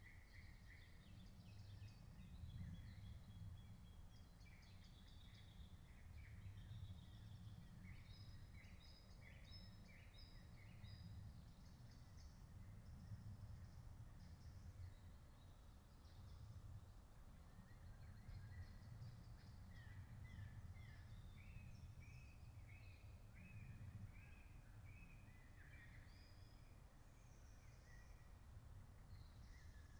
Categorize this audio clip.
Soundscapes > Nature